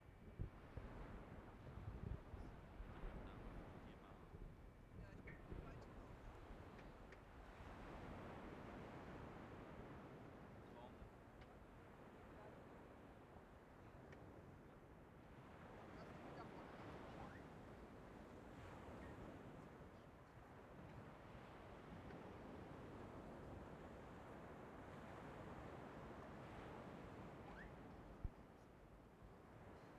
Soundscapes > Urban
AMB Calella beach waves German tourists LR
Calella beach with German speaking tourists.
barcelona, calella, catalunya, field-recording, german, stereo, tourists, waves